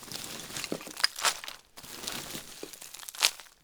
Sound effects > Natural elements and explosions
Moving woody gravel by hand (XY)
Subject : Moving some wood bark rubble into a pile in-front of the microphone. Date YMD : 2025 04 22 Location : Gergueil France. Hardware : Tascam FR-AV2, Rode NT5 Weather : Processing : Trimmed and Normalized in Audacity.
2025, dust, FR-AV2, grabbing, hand, handheled, indoor, NT5, Rode, rubble, Tasam, wood, wood-bark, XY